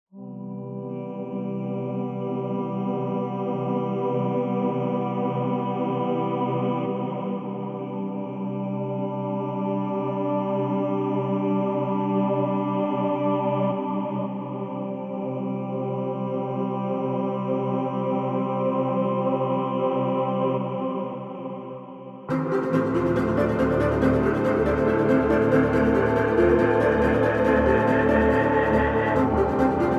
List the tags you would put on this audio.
Music > Multiple instruments
adventure
brass
cello
choir
choral
cinematic
classical
drama
epic
horns
music
opera
orchestra
ostinato
soprano
strings
trailer
violin

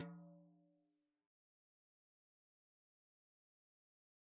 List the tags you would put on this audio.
Music > Solo percussion
toms
roll
studio
hi-tom
fill
drumkit
rimshot
percs
flam
drum
beatloop
tomdrum
rim
percussion
hitom
drums
acoustic
perc
instrument
velocity
oneshot
tom
beats
kit
beat

